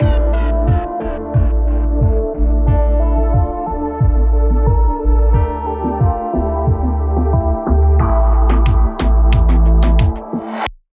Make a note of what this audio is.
Music > Multiple instruments
SOTO ASA FILTERED PERC
cool reggaeton loop. Part of a whole beat AI generated: (Suno v4) with the following prompt: generate a reggaeton instrumental inspired in the spanish scene (soto asa), at 90 bpm, in D minor.
ai-generated, reggaeton, bass